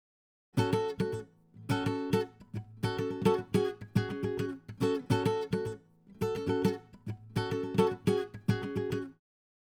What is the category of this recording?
Music > Solo instrument